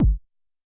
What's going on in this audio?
Instrument samples > Percussion
Kick Jomox Alpha Base-A
The sound was recorded from my drum machine.
bass-drum, drum, jomox, kick